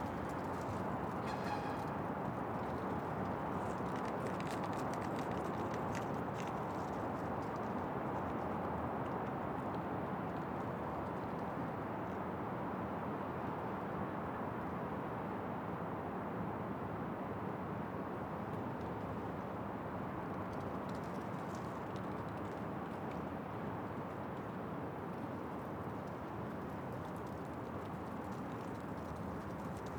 Soundscapes > Nature
Recorded on 29 December 2025 at the ruins of Pirita Convent, using a Schoeps MiniCMIT GR microphone with a Rycote Windshield Kit MiniCMIT. Recorder: Sound Devices MixPre-6 II, testing different NoiseAssist settings. Audible elements include leaf rustling and relatively strong wind. The weather was cold (−2 °C). For this specific recording, NoiseAssist is set to 0 dB. Salvestaja Sound Devices MixPre-6 II kus katsetasin erinevaid NoiseAssist seadistusi. Kuulda on lehtede klõbinat ja suhteliselt tugevat tuult. Ilm oli väga kõle, -2 kraadi C. Selle konkreetse salvestise juures on N.Asst seadistatud 0 dB.